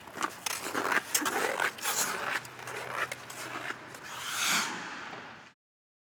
Sound effects > Other
Ice Hockey Sound Library LR Strides with stop
Taking clear, exaggerated, deep-cutting strides past the microphone to create a skating doppler effect for various design purposes.